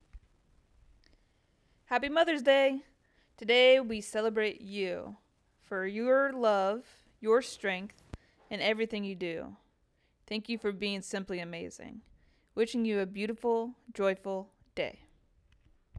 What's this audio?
Speech > Solo speech
Sweet Mother's Day Greeting – Warm and Heartfelt
A warm and heartfelt Mother's Day greeting, perfect for cards, videos, or personal messages. Friendly, loving, and full of positive energy. Script: "Happy Mother’s Day! Today, we celebrate you – for your love, your strength, and everything you do. Thank you for being simply amazing. Wishing you a beautiful, joy-filled day!"
FamilyLove, Gratitude, HappyMothersDay, LoveYouMom, MothersDay, VoiceOver